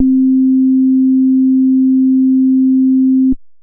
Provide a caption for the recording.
Instrument samples > Synths / Electronic
02. FM-X ALL 1 SKIRT 0 C3root
Montage, FM-X, Yamaha, MODX